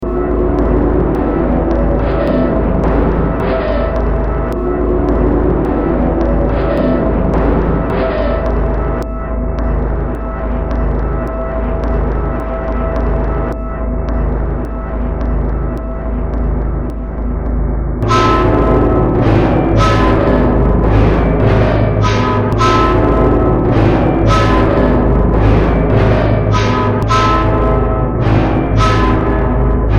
Music > Multiple instruments
Demo Track #3796 (Industraumatic)
Ambient, Games, Sci-fi, Cyberpunk, Horror, Soundtrack, Underground, Industrial, Noise